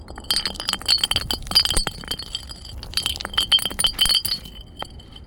Sound effects > Objects / House appliances
A glass bottle rolling down a hill recorded on my phone microphone the OnePlus 12R
outside, rolling, glass, glass-bottle